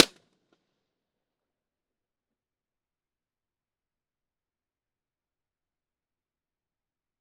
Soundscapes > Other
I&R Albi Passerelle du pont (behind an arch) - NT5-o
Subject : Impulse response for convolution reverb. Date YMD : 2025 August 11 Early morning. Location : Albi 81000 Tarn Occitanie France. Mostly no wind (Said 10km/h, but places I have been were shielded) Processing : Trimmed and normalised in Audacity. Very probably trim in, maybe some trim out.
city, bridge, NT5o